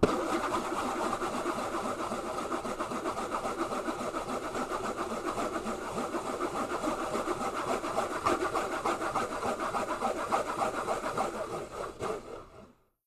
Sound effects > Objects / House appliances
Twirling swishes. Simulating a spinning top.